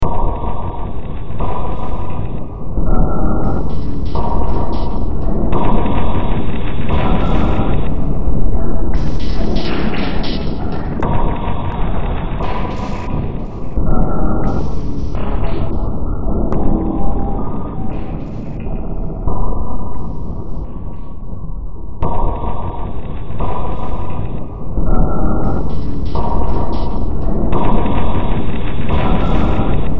Music > Multiple instruments
Demo Track #3962 (Industraumatic)
Ambient, Noise, Soundtrack, Sci-fi, Cyberpunk, Underground, Games, Horror, Industrial